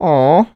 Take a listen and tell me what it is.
Speech > Solo speech
Affectionate Reactions - hawwn
dialogue, Single-take, Human, aww, Tascam, affectionate, Man, U67, Male, oneshot, NPC, haww, Video-game, talk, singletake, Voice-acting, Neumann, cute, Vocal, voice, FR-AV2, Mid-20s